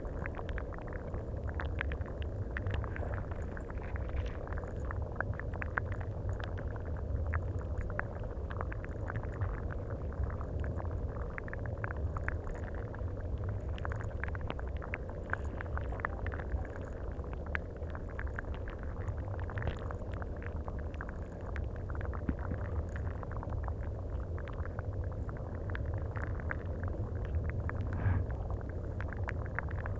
Soundscapes > Nature
Underwater - seashore
Underwater during low tide, in France
field-recording, ocean, shore, underwater, seaside, sea